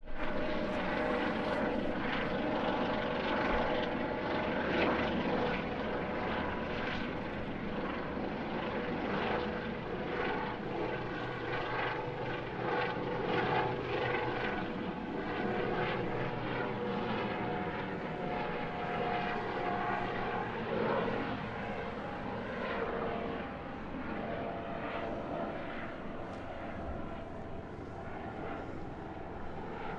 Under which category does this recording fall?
Sound effects > Vehicles